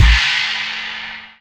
Instrument samples > Percussion
China 1 bassier
tags: Avedis bang China clang clash crack crash crunch cymbal Istanbul low-pitched Meinl metal metallic multi-China multicrash Paiste polycrash Sabian shimmer sinocrash Sinocrash sinocymbal Sinocymbal smash Soultone spock Stagg Zildjian Zultan
sinocrash, china, metal, metallic, spock, bang, clang, cymbals, Sabian, Meinl, smash, multicrash, low-pitched, Zildjian, Soultone, crash, polycrash, crack, cymbal, Istanbul, crunch, Zultan, sinocymbal, Stagg, Avedis, Paiste, clash